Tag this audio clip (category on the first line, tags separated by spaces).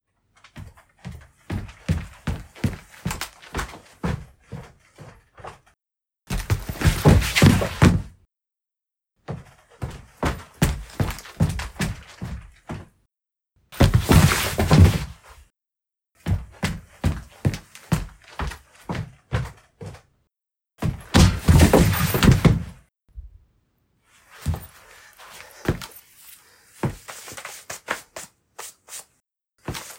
Sound effects > Human sounds and actions
collapse crash drop dropping fall falls floor flooring ground impact slide-whistle swoon thud tumble wooden